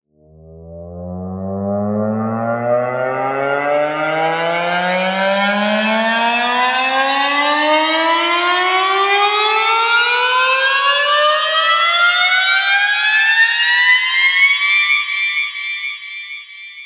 Sound effects > Electronic / Design
Standard Riser/Powerup
Electronic, Riser